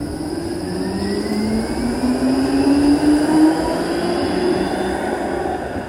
Sound effects > Vehicles
tram sunny 04
tram, sunny, motor